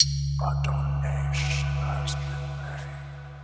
Sound effects > Electronic / Design
Inspiration comes from a ritual offer of a coin impacting and crafted to represent the moment an offering is received. Created from scratch in FL Studio using FPC metallic layers, a sub-bass drone, choral aahs, and processed vocals. Combines metallic clinks, reverberant drones, and a deep chamber voice declaring the acceptance. Add the credit in your video description, game credits, or project page.
cinematic-impact, stream-sound